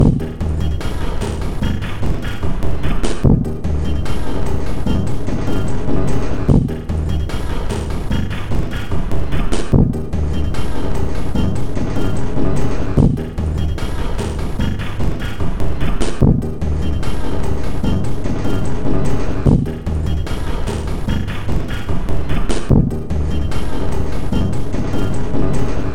Instrument samples > Percussion
This 74bpm Drum Loop is good for composing Industrial/Electronic/Ambient songs or using as soundtrack to a sci-fi/suspense/horror indie game or short film.
Loopable, Ambient, Dark, Drum, Samples, Packs, Underground, Industrial, Loop, Soundtrack, Alien, Weird